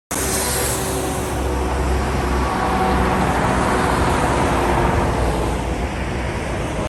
Sound effects > Vehicles
Sun Dec 21 2025 (6)
highway, road, truck